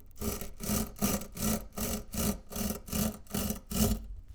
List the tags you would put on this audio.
Sound effects > Human sounds and actions
cut
metal
sound
wood

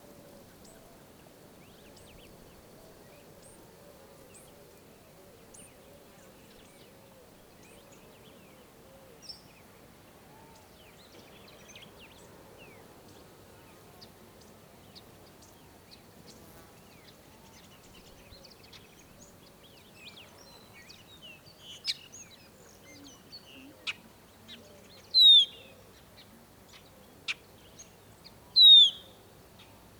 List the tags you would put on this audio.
Soundscapes > Nature
Ansenuza
Argentina
Birds
Cordoba
Free
Spring

